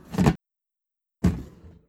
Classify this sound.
Sound effects > Objects / House appliances